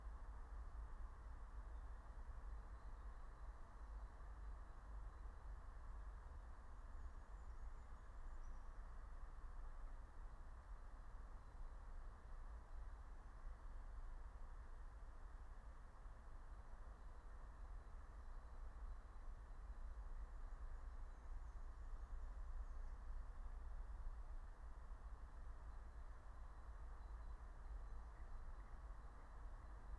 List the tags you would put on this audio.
Soundscapes > Nature
alice-holt-forest
natural-soundscape
phenological-recording
raspberry-pi
soundscape
nature
meadow
field-recording

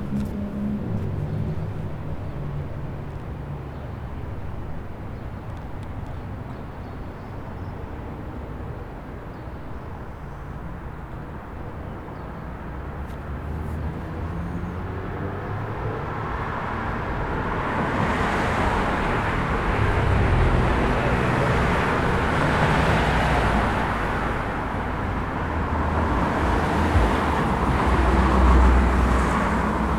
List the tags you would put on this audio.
Soundscapes > Urban
ambience
subburb
town
traffic
townsquare
soundscape

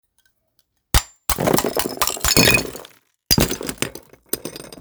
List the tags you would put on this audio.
Sound effects > Objects / House appliances
ceramic
smash
pottery
breaking

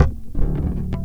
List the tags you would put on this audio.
Instrument samples > String
pluck
plucked
loop
charvel
oneshots
blues
slide
loops
bass
electric
funk
fx
mellow
riffs
rock

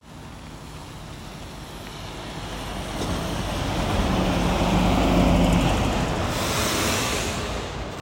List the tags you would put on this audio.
Soundscapes > Urban
bus
transport
vehicle

